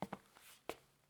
Sound effects > Human sounds and actions
footsteps, tile, shufle
shuffling, tile